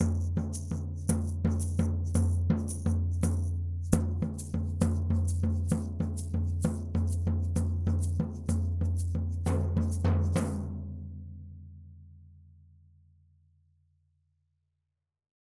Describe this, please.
Music > Solo percussion
floor tom- polyrhythm with shaker 2 - 16 by 16 inch
oneshot, beats, rim, roll, flam, acoustic, perc, beatloop, velocity, floortom, tom, studio, percussion, drums, drumkit, instrument, toms, drum, tomdrum, rimshot, fill, kit, percs, beat